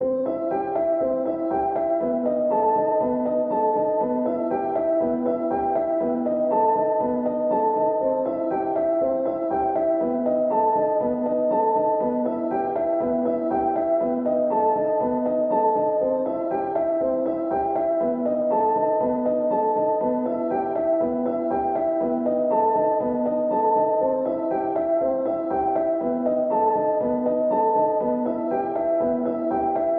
Music > Solo instrument
Piano loops 149 efect 4 octave long loop 120 bpm
120, 120bpm, free, loop, music, piano, pianomusic, reverb, samples, simple, simplesamples